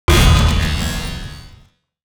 Sound effects > Other
Sound Design Elements Impact SFX PS 077
transient, collision, hit, audio, thudbang, cinematic, shockwave, effects, percussive, rumble, power, crash, sound, heavy, explosion, impact, design, smash, strike, hard, force, sharp, game, blunt, sfx